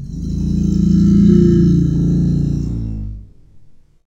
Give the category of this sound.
Sound effects > Experimental